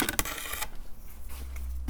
Sound effects > Other mechanisms, engines, machines
metal shop foley -159
boom, fx, oneshot, percussion, sfx, sound, thud, wood